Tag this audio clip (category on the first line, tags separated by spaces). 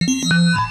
Sound effects > Electronic / Design
alert confirmation digital interface massage selection